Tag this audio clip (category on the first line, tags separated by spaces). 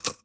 Sound effects > Other
cash change coins game glint interface jingle loose metallic money ring small ui